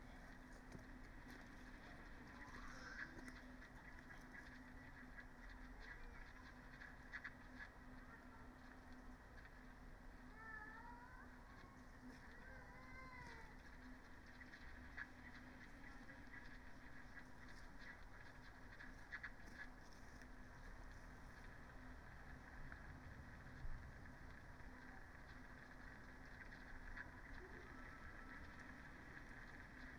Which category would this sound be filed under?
Soundscapes > Nature